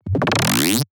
Sound effects > Electronic / Design
Rewind sound
This sound was created and processed in DAW; Weird sound effect i got while experimenting with distortion that i thought was cool. One guy said it's sounds like a rewind effect but i myself have no idea where could one use it, so just use your imagination. Ы.
rewind, sound-design, weird